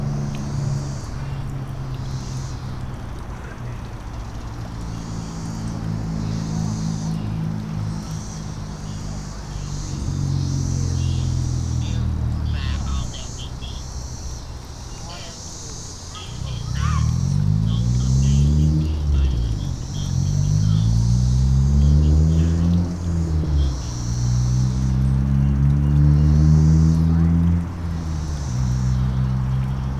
Soundscapes > Urban
AMBPubl-Summer City Park with large fountain and walking path, birdsong distant traffic, Eden Park Wedding Gazebo QCF Cincinnati Ohio Zoom H4n Pro
The Wedding Gazebo in Eden Park, Cincinnati, Ohio. A large fountain and walking trail. Passing traffic, birdsong, wind.
day, fountain, summer, birdsong, park, traffic